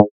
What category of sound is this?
Instrument samples > Synths / Electronic